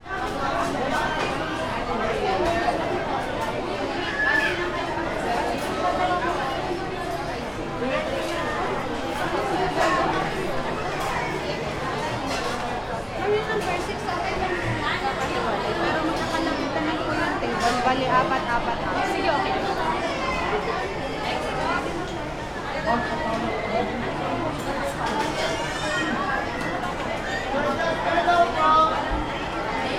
Speech > Conversation / Crowd
250802 120416 PH Rush hour in a Filipino restaurant
Rush hour in a busy Filipino restaurant. I mad this recording in Mang Inasal restaurant, in SM Batangas shopping mall, at lunch time. One can hear lots of customers ordering and paying, while the staff of the restaurant make its best to deliver the dishes on time and satisfy everyone ! Recorded in August 2025 with a Zoom H5studio (built-in XY microphones). Fade in/out applied in Audacity.
restaurant, crowd, busy, dishes, kids, noise, counter, women, people